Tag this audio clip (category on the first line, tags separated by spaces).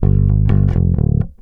Instrument samples > String
pluck slide blues loop rock funk bass electric loops riffs fx oneshots plucked mellow charvel